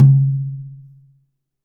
Music > Solo instrument
Mid High Tom Sonor Force 3007-002
Sabian, Drums, FX, Cymbal, Custom, Crash, Paiste, Ride, Oneshot, Hat, Kit, GONG, Drum, Cymbals, Metal, Percussion, Perc